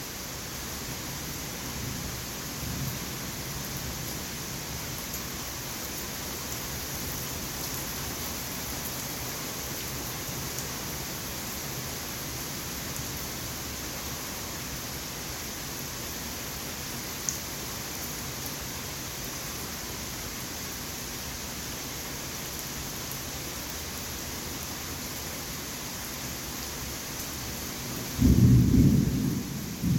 Soundscapes > Nature
STORM-Samsung Galaxy Smartphone, CU Medium Distant Rainshower, Thunder Booms, Rumbles Nicholas Judy TDC
A medium distant rainshower overlaying thunder rumbles and booms.
boom, medium-distant, overlay, Phone-recording, rainshower, rumble, thunder